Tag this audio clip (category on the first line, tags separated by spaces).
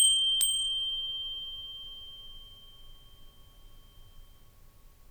Instrument samples > Other
bowls tibet tibetan